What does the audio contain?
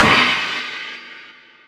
Instrument samples > Percussion
• digitally low-pitched crash: 16" (inches) Sabian HHX Evolution Crash • attack 1 octave lower and attenuated the 16" (inches) Sabian HHX Evolution Crash stepped fade out Many times I use stepped fade out. Neuroscientifically it's good because it gives better perceived informational chunks.